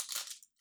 Instrument samples > Percussion
Cellotape Percussion One Shot9
Cellotape Percussion One-Shots A collection of crisp, sticky, and satisfyingly snappy percussion one-shots crafted entirely from the sound of cellotape. Perfect for adding organic texture, foley-inspired rhythm, or experimental character to your beats. Ideal for lo-fi, ambient, glitch, IDM, and beyond. Whether you're layering drums or building a track from scratch, these adhesive sounds stick the landing.